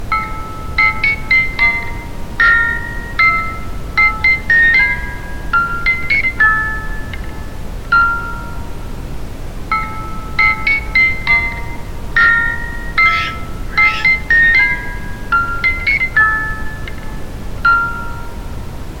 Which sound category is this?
Music > Solo instrument